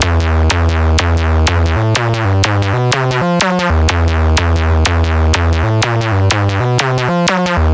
Music > Solo instrument
124 Polivoks Malfunction 01
Melody, Texture, Soviet, Synth, Brute, Electronic, Vintage, Analogue, 80s, Polivoks, Casio, Analog, Loop